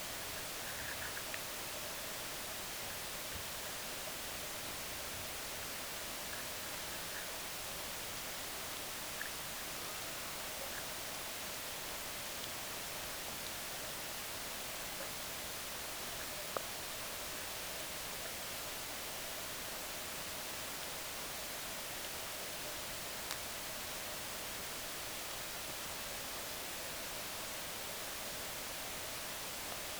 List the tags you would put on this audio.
Soundscapes > Other
under-water
calm
81000
throwing-rock-in-water
FR-AV2
Albi
Ruisseau-de-caussels
Hydrophone
splash
stream
Tascam

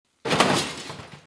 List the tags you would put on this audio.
Sound effects > Other
glass
crash
smash

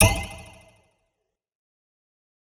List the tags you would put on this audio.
Sound effects > Experimental
sci-fi-athletics
zero-g-sports
space-travel-sport
sci-fi-racquet-sports
sci-fi-sport
sci-fi-racquet-hit
futuristic-sport
sci-fi-sports
sci-fi-weapon-parry
futuristic-sports
energy-shield
sci-fi-racquet
sci-fi-sports-sounds
z-ball
laser-racquet-hit
sci-fi-baseball
sports-of-the-future
energy-shield-collision
sci-fi-weapon-block
sci-fi-bludgeon-hit
sci-fi-hit
sci-fi-weapon
sci-fi-collision

